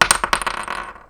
Sound effects > Objects / House appliances
FOLYProp-Blue Snowball Microphone, CU Seashell, Clatter 04 Nicholas Judy TDC
A seashell clattering.
Blue-brand, clatter, seashell